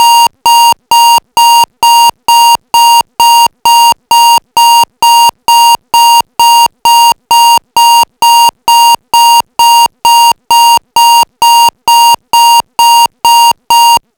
Sound effects > Electronic / Design
Intending to re-do a digital alarm clock sound, I generated a 884 hz square wave on Audacity and sampled it though my Pocket Operator PO-33 to crunchy lo-fi it. Made and recorded on the 2025 05 12. Using a PO-33 and Zoom H2n. Trimmed and Normalized in Audacity.